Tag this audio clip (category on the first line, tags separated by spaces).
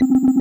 Sound effects > Electronic / Design
selection confirmation digital interface message alert